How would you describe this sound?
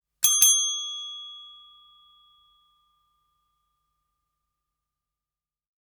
Sound effects > Objects / House appliances
Ring the receptionist's bell twice
Bell, call, chime, counter, hall, hotel, meeting, motel, office, reception, service, tourism